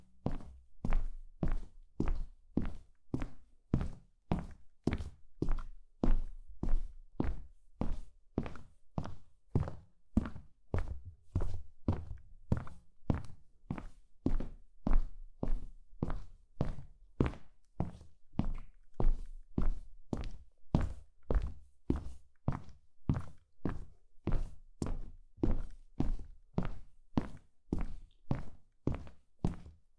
Sound effects > Objects / House appliances
Footsteps On Hard Laminate Floor
Close/medium-mic recording of walking on laminate flooring.
floor, foley, footsteps, hardsurface, interior, laminate, movement, pacing, room, sfx, shoes, step, texture, walking